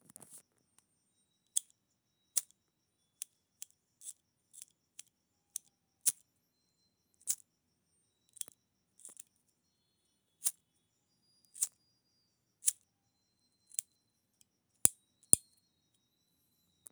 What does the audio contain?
Sound effects > Human sounds and actions
FIREIgnite lighter initial hissy sparkly sound not getting fire NMRV FSC2
trying to lioght a lighter but no fire comes up